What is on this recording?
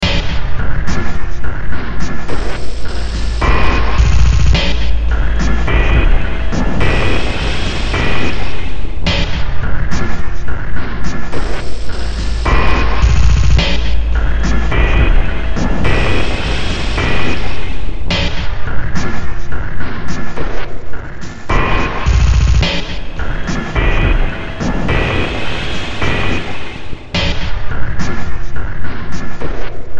Music > Multiple instruments
Demo Track #3882 (Industraumatic)
Soundtrack, Cyberpunk, Industrial, Ambient, Games, Noise, Sci-fi, Underground, Horror